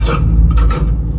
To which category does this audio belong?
Sound effects > Objects / House appliances